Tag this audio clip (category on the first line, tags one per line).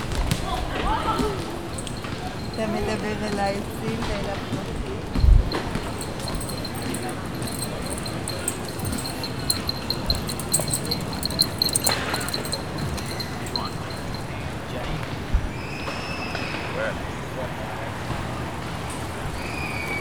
Soundscapes > Urban
recording
park
walk
field